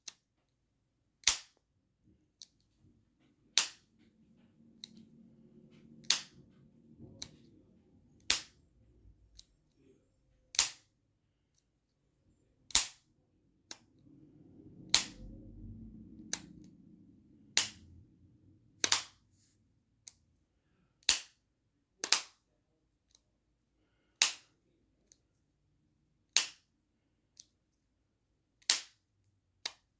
Sound effects > Objects / House appliances
Apple airpods case opening and closing.